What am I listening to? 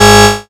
Instrument samples > Synths / Electronic
DRILLBASS 2 Ab
fm-synthesis, additive-synthesis, bass